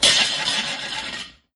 Sound effects > Objects / House appliances
METLImpt-Samsung Galaxy Smartphone, CU Circular Tray, Drop, Spin Nicholas Judy TDC
A circular tray dropping and spinning.
drop, spin, circular, tray, Phone-recording